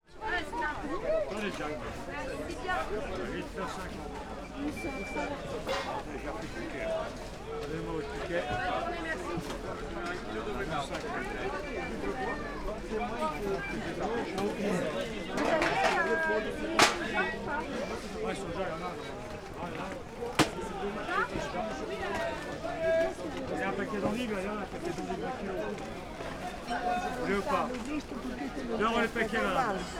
Soundscapes > Urban
250501 104438 FR Downtown market
Downtown market. (take 2) Here we are in an outdoor market located in Nanterre downtown (suburbs of Paris, France). One can hear the atmosphere of the market, with vendors promoting and selling their products (they’re mostly talking in French, but also in their own languages as some of them are from North-African countries), while customers are chatting and buying. In the background, usual noise from market, and almost no noise from the surrounding streets, as the recording has been made during Labour Day. Recorded in May 2025 with a Zoom H5studio (built-in XY microphones). Fade in/out applied in Audacity.
ambience, atmosphere, busy, buyers, buying, chatting, city, crowd, customers, downtown, field-recording, France, French, general-noise, lively, market, Nanterre, outdoor, people, sellers, selling, shouting, soundscape, suburban, talking, town, ubbub, vendors, voices, walla